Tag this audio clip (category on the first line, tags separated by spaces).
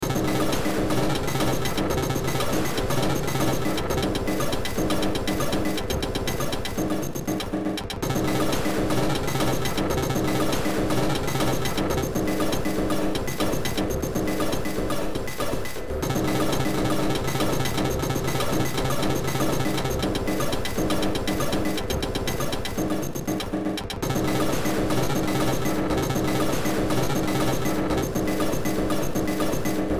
Music > Multiple instruments
Ambient Games Horror Soundtrack Cyberpunk Sci-fi Industrial Underground Noise